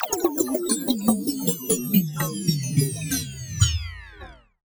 Sound effects > Electronic / Design
FX-Downlifter-Glitch Downlifter 9
Effect, Glitch